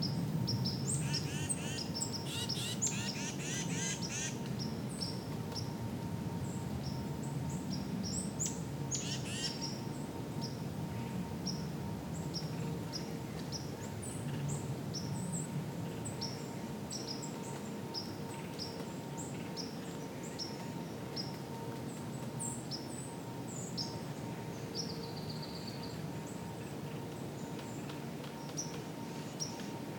Soundscapes > Nature
Forest Ambience with Birds
Forest ambience outside with many bird calls Sound is my own, recorded on an iPhone 12
ambience, birds, forest, outdoors